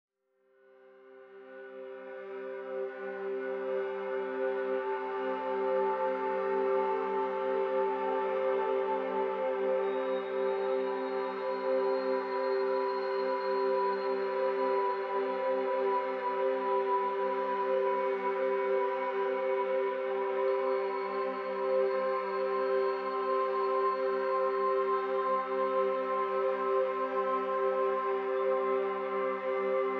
Soundscapes > Synthetic / Artificial
Ambient, Pad - Neon Rain
Calm... Ambient serenity. Drift away with beautiful, expansive pads. It can be ideally used in your projects. Not used ai-generated. 90 Bpm Thank you!
pad, electronic, soundscape, atmosphere, emotional, ambience, dark, ambient, atmospheric, calm, experimental, chill, drone, space, music, relax, deep, sci-fi, synth